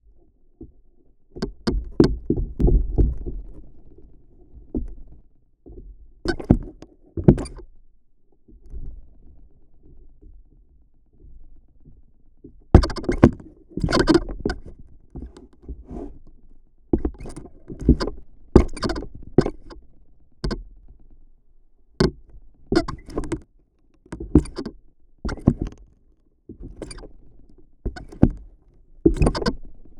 Sound effects > Natural elements and explosions
WOODFric-Contact Mic Creaks of planks SoAM Sound of Solid and Gaseous Pt 1 Construction site
wooden, wood, contact, walk, foot, footstep, step, steps, walking, footsteps